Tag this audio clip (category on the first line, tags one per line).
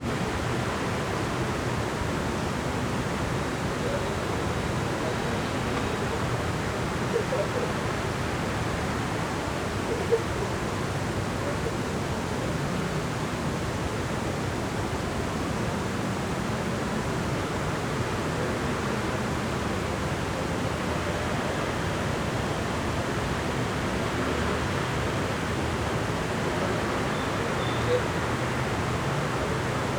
Soundscapes > Urban
nature
cassette
urban
hiss
atmosphere
vintage
lofi
ambience
saturated
noise
fieldrecording
outdoor
degraded
soundscape
park
tape
analog